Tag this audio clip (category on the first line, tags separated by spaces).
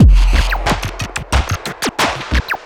Music > Other
digital glitch loop modular sound-design soundeffect